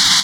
Instrument samples > Percussion
China 2 - 20 inches Zildjian Oriental Trash short
Slightly low-pitched part of my Zildjian China and spock crashes in various lengths (see my crash folder). tags: spock Avedis bang China clang clash crack crash crunch cymbal Istanbul low-pitchedmetal Meinl metallic multi-China multicrash Paiste polycrash Sabian shimmer sinocrash Sinocrash sinocymbal Sinocymbal smash Soultone Stagg Zildjian Zultan